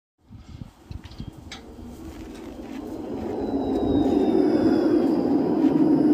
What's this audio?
Soundscapes > Urban
final tram 19
finland, hervanta, tram